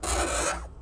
Sound effects > Objects / House appliances
COMPhono-Blue Snowball Microphone, CU Record, Scratch Nicholas Judy TDC
A record scratch. Created using a fingernail scratching a lamp shade.